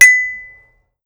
Music > Solo percussion
MUSCTnprc-Blue Snowball Microphone, CU Agogo Bells, Single High Note Nicholas Judy TDC
A single, high agogo bell note.